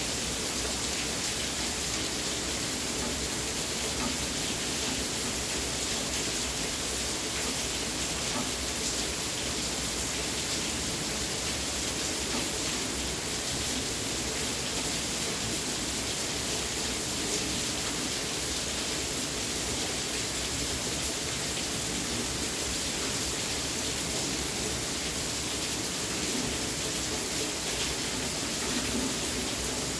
Soundscapes > Nature
RAIN-Samsung Galaxy Smartphone, CU Heavy Nicholas Judy TDC
nature, heavy, Phone-recording, rain